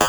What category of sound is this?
Sound effects > Electronic / Design